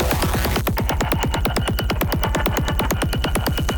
Music > Multiple instruments
Industrial Estate 1
techno, chaos, 120bpm, Ableton, loop, industrial, soundtrack